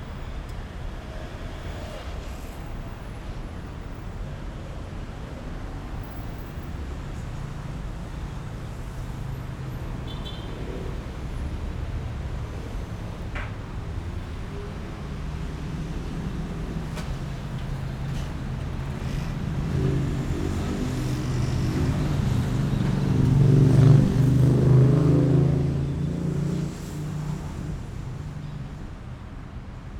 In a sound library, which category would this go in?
Soundscapes > Urban